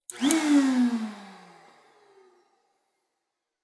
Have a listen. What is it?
Sound effects > Other mechanisms, engines, machines
Dewalt 12 inch Chop Saw foley-051
Blade, Chopsaw, Circularsaw, Foley, FX, Metal, Metallic, Perc, Percussion, Saw, Scrape, SFX, Shop, Teeth, Tool, Tools, Tooth, Woodshop, Workshop